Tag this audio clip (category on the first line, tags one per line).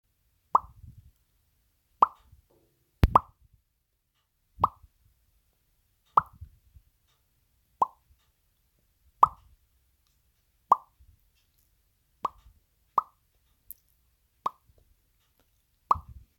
Sound effects > Human sounds and actions
popping
lips
lip